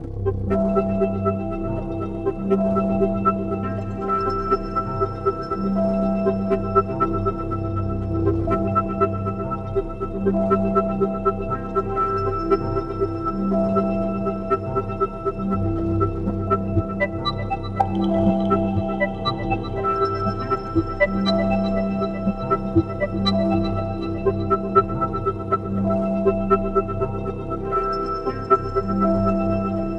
Music > Multiple instruments
Guitar Granular Loop at 120bpm
A guitar loop at 120bpm with granular texture on top Recorded with my guitar on Torso S4 and processed with it